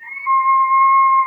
Instrument samples > Wind
A recorder playing a C note. Info: a low quality recorder playing the C note, recorded on the computer.